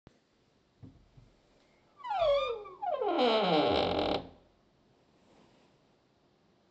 Soundscapes > Indoors
Creaking wooden door v11
Door, Room, Wooden